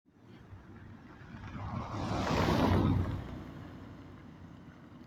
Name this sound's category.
Sound effects > Vehicles